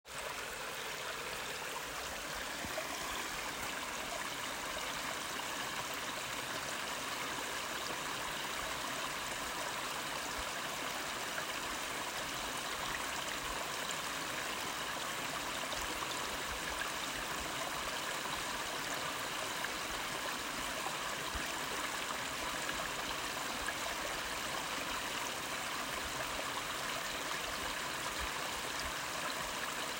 Sound effects > Natural elements and explosions
Small Brook Flowing Around Rocks
Field recording of a small brook flowing gently around rocks. Captured outdoors with natural ambience, this sound features the continuous flow of water creating a calming, relaxing atmosphere. Suitable for use in nature soundscapes, meditation, background ambience, or sound design. Recorded with iPhone 13.